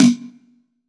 Instrument samples > Percussion
HR V10 Snare
digital, drum, drums, machine, one-shot, physical-modelling, sample, snare, stereo